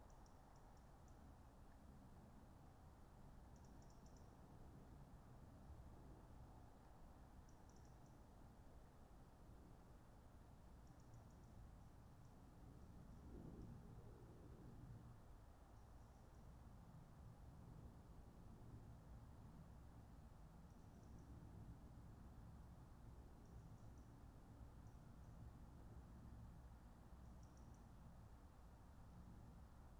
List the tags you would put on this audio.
Soundscapes > Nature
raspberry-pi natural-soundscape data-to-sound phenological-recording nature artistic-intervention sound-installation Dendrophone soundscape alice-holt-forest weather-data field-recording modified-soundscape

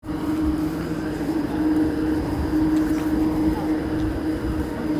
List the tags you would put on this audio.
Sound effects > Vehicles
tram field-recording traffic city Tampere

